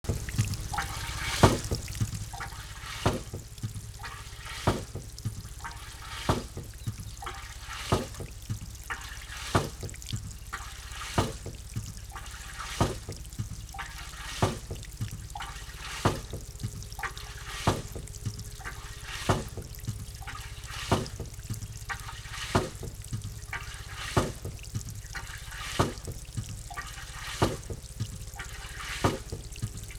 Soundscapes > Other
The sound of a water pump at a spring going up Gnipen in Switzerland. Recorded on 28 April 2026 with Clippy Em272s.